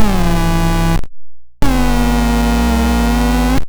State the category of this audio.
Sound effects > Electronic / Design